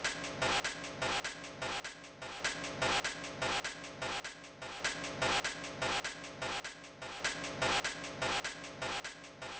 Instrument samples > Percussion
This 200bpm Drum Loop is good for composing Industrial/Electronic/Ambient songs or using as soundtrack to a sci-fi/suspense/horror indie game or short film.
Alien, Ambient, Dark, Drum, Industrial, Loop, Loopable, Packs, Samples, Soundtrack, Underground, Weird